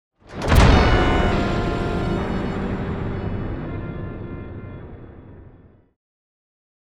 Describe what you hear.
Sound effects > Other
Sound Design Elements Impact SFX PS 097
A powerful and cinematic sound design impact, perfect for trailers, transitions, and dramatic moments. Effects recorded from the field.